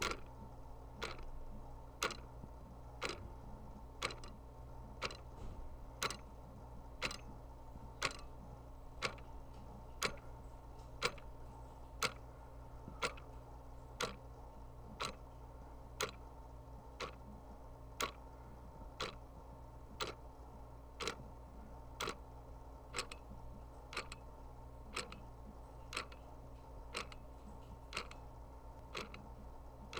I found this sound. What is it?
Sound effects > Objects / House appliances
CLOCKTick-Blue Snowball Microphone, MCU Wall Nicholas Judy TDC
A wall clock ticking.
clock, wall, Blue-Snowball, tick, Blue-brand